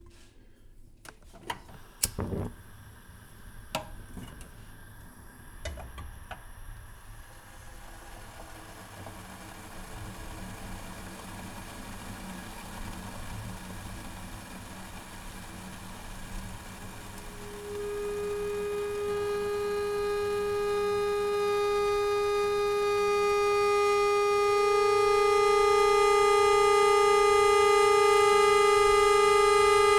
Sound effects > Objects / House appliances
An electric kettle is put on and starts to sing some strong, inharmonic, melodica-like notes.